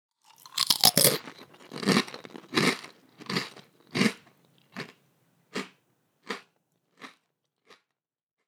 Sound effects > Other
FOODEat Cinematis RandomFoleyVol2 CrunchyBites HummusChipsBite ClosedMouth SlowChew 01 Freebie
texture, handling, postproduction, food, SFX, recording, hummus, effects, chips, bite, snack, crunch, plastic, crunchy, foley, design, sound, bag, bites, rustle